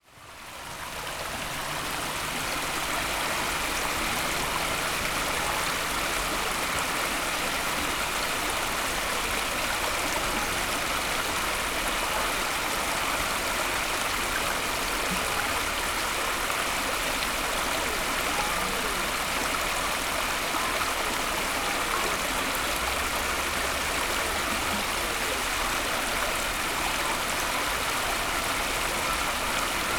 Soundscapes > Nature
A recording of water flowing through a stream at Wolseley Nature reserve.
flowing, field, birds, nature, wildlife, reserve, ambience, recordings, water, stream